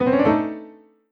Instrument samples > Piano / Keyboard instruments
Studio Grand Notification
Got on Bandlabs and made this short sound, sounded a bit like a notification or when you get something wrong in a maths game.
effect, Sample, game, Music, digital, sfx, Instrument, ui, notification, sound